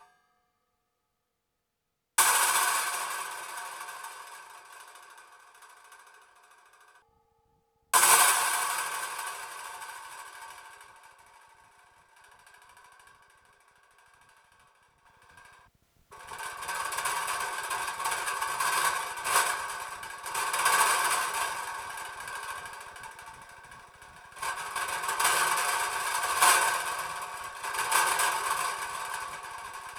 Sound effects > Objects / House appliances
Contact mic recording of knives and forks being banged. Recorded on zoom h2.